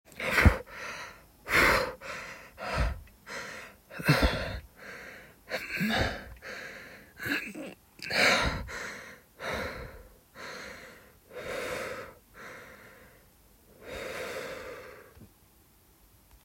Sound effects > Human sounds and actions
Recorded using my iphone to use as a sample in a short film